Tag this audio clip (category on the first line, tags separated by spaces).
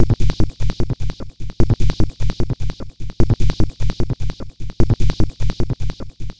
Instrument samples > Percussion
Ambient Industrial Underground Alien Loopable Samples Packs Drum Dark Loop Soundtrack Weird